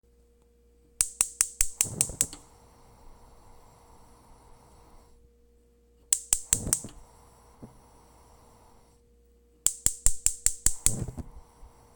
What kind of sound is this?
Sound effects > Objects / House appliances
FOODCook spark pop squeak hiss vibration echo tapping
The stove turns on with sparks and tapping, producing the sound of metal and gas igniting. Mexico City.
squeak, hiss, echo, tapping, vibration, pop, spark